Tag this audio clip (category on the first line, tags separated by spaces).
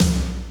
Instrument samples > Percussion
1lovewav 80s snare distorted